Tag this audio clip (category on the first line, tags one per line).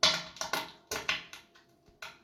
Sound effects > Other
shit
poop
toilet